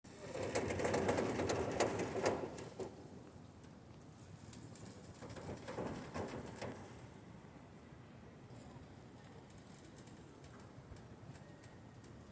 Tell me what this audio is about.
Sound effects > Natural elements and explosions
Skywalk bridge at Sequoia Park Zoo in Eureka, California, creaking, closer... and farther... and farther.